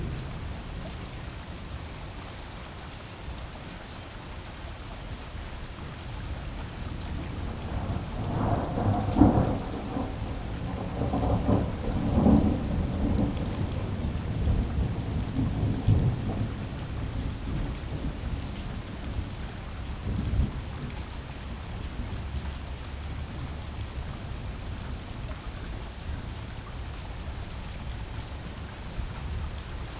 Soundscapes > Nature
Thunderstorm, Provence, France. 3
Recording of a thunderstorm in rural Provence, France in april 2025. The is third of three perspectives. In this one there is medium focus on raindrops. Recorded on 29th April 2025. Recorded using Sennheiser MKH 8040s in ORTF arrangement.